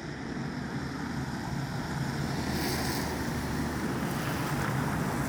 Soundscapes > Urban
voice 16 14-11-2025 car
Car, CarInTampere, vehicle